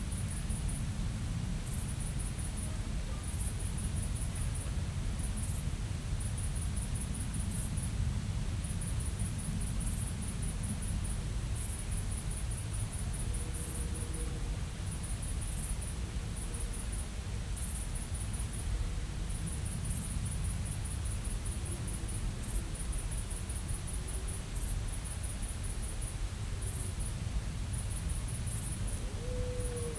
Soundscapes > Nature
20250905 22h28 Gergueil Garden ambience DJI single
Subject : Ambience recording from a garden in Gergueil. Recorded from under a wheel barrow. Date YMD : 2025 September 05 Location : Gergueil 21410 Bourgogne-Franche-Comte Côte-d'Or France Hardware : Dji Mic 3 internal recording. Weather : Processing : Trimmed and normalised in Audacity.